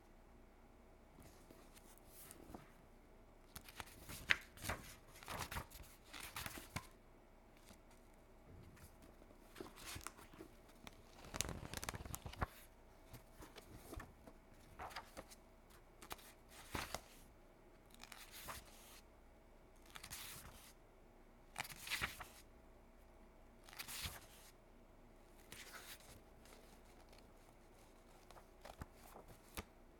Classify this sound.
Sound effects > Objects / House appliances